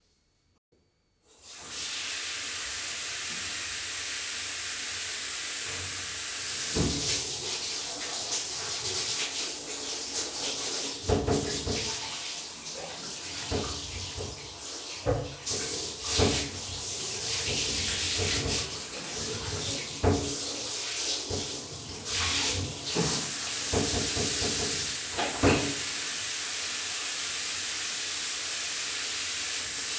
Soundscapes > Indoors
You can hear someone running a bath, that is, filling a bathtub with water. Microphones were placed in the hallway outside the bathroom to capture more ambient sound, and only one microphone was placed directly in the bathroom. This is the Single Track from the Mic that was outside the Bathroom in the floor, to generate more "Room-Tone". However there are the other single tracks and a Mixed-Version although available.
bathroom, running